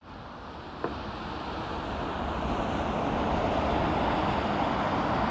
Soundscapes > Urban
Bus driving by recorded on an iPhone in an urban area.